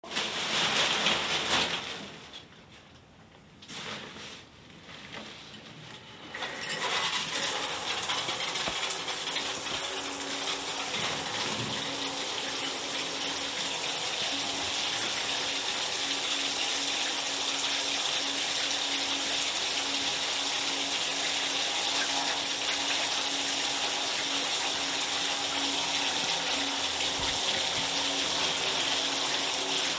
Sound effects > Human sounds and actions
ducha/shower
Taking a shower. Tomando una ducha, desde el comienzo, antes de abrir el grifo.